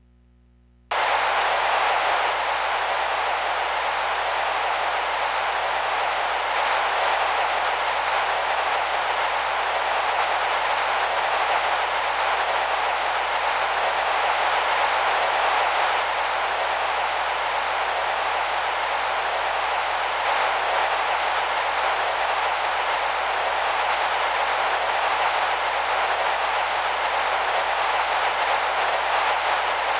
Sound effects > Objects / House appliances
Processed white noise made to sound like radio static.
Electronics, Radio, Static